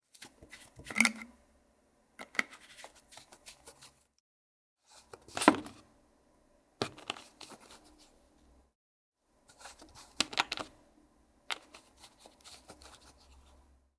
Sound effects > Objects / House appliances
Drink, Bottle, Open, Close, Plastic, Handle
Recorded with a Tascam DR-05X. Thank you!
Close, Plastic, Bottle, Drink, Open, Handle